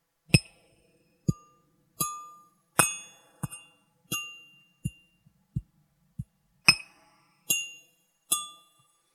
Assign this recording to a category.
Sound effects > Objects / House appliances